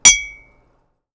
Sound effects > Objects / House appliances
FOODGware-Blue Snowball Microphone, CU Ceramic Mug Ding 02 Nicholas Judy TDC
A ceramic mug ding.
Blue-brand, Blue-Snowball, ceramic, ding, foley, mug